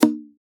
Sound effects > Objects / House appliances
Ribbon Trigger 3 Tone
Playing a stretched satin ribbon like a string, recorded with a AKG C414 XLII microphone.
ribbon, satin-ribbon, tone